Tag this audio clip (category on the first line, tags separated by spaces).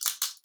Instrument samples > Percussion
adhesive ambient cellotape cinematic creative design DIY drum electronic experimental foley found glitch IDM layering lo-fi one organic pack percussion sample samples shot shots sound sounds tape texture unique